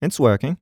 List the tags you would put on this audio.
Speech > Solo speech
Shotgun-mic
Male
Hypercardioid
Sennheiser
mid-20s
Calm
july
Shotgun-microphone
Single-mic-mono
its-workin
VA
FR-AV2
working
MKE600
MKE-600
Tascam
Adult
Generic-lines
2025
Voice-acting

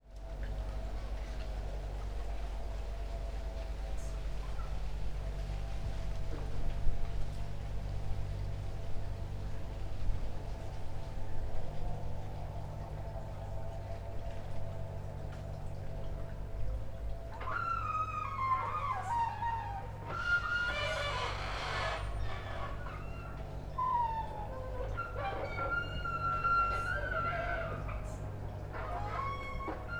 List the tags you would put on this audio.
Soundscapes > Other
nature
chainsaw
forest
Tacligan
engine
soundscape
working
mangrove
water-stream
breeze
work
Philippines
ambience
noise
hammering
atmosphere
field-recording
excavator
birds
voices
chainsaws
works
bulldozer

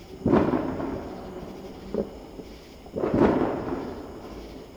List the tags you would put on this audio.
Sound effects > Other
United-States
sample-packs
america
samples
experimental
independence
sfx
fireworks
patriotic
free-samples
fireworks-samples
day
electronic
explosions

